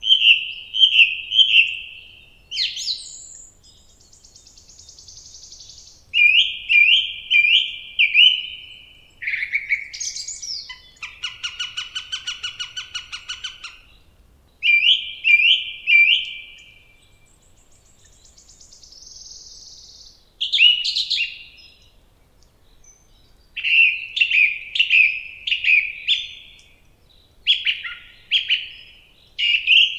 Sound effects > Animals
Beautiful birdsong 5
ambience, atmosphere, background, birds, birdsong, calm, environmental, European-forest, field-recording, forest, natural, nature, outdoor, peaceful, Poland, rural, singing, soundscape, wild